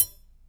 Sound effects > Objects / House appliances
knife and metal beam vibrations clicks dings and sfx-130
Beam, Clang, ding, Foley, FX, Klang, Metal, metallic, Perc, SFX, ting, Trippy, Vibrate, Vibration, Wobble